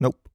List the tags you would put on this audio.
Speech > Solo speech
2025
Adult
Calm
FR-AV2
Generic-lines
Hypercardioid
july
Male
mid-20s
MKE-600
MKE600
no
nope
Sennheiser
Shotgun-mic
Shotgun-microphone
Single-mic-mono
Tascam
VA
Voice-acting

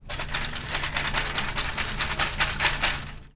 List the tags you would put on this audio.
Sound effects > Other mechanisms, engines, machines
Jingle
Metal
Rattle

